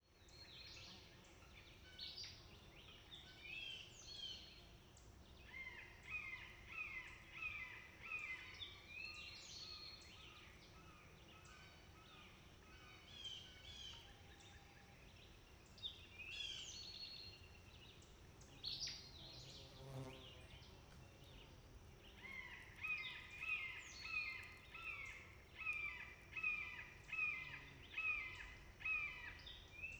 Soundscapes > Nature
Hot summer woods hawk Deer snort Little Grassy RTR Trail July 2 2025
A busy recording made in heavy woods of the Crab Orchard National Wildlife Refuge in southern Illinois, on Wednesday July 2 2025. Like most deciduous forests in the northern hemisphere, summer is when the majority of birds are the warblers and other migrants that live in central America and the Caribbean in winter. During summer, these birds invade these full-of-food forests to gorge on insects and raise a family. For those of you interested in the names of some of the birds that you hear on this audio clip, they are, in no particular order: Indigo Bunting, Eastern Towhee, Red-Shouldered Hawk, Red-Eyed Vireo, Blue Jay, Acadian Flycatcher, and a Hooded Warbler. Also noteworthy in this recording occurs at 40 seconds in, 44 seconds in and again at 52 seconds in. These are the times a male Deer expresses his unhappiness at my being there.! Startled me as I stood there surrounded by thick forests. But, after all, I was in his living room. Recorded with Sound Devices 702 audio recorder.
Birds, Forest, Nature, naturesound, Summer